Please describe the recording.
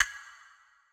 Sound effects > Objects / House appliances
Clock Ticking
From a recording of my phone,It was a sound recorded from a tomb-sweeping day,I was wanna record the sound of firecracker,but it was overloud!so my phone overcompress the sound,I was sounds bad,But I find a part like this sound then cut it down,did some process for it :)
Cinematic, Video, Game, Tension, Sfx, time, Sound, effects, Seconds, Fx, Clock, Tick, ticking